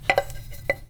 Music > Solo instrument
Sifting Through Loose Marimba Keys Notes Blocks 1-001
block, loose, foley